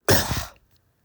Sound effects > Human sounds and actions
Really bad cough
Improvised by me coughing into the microphone.
bad-cough, Cough, sick, sneeze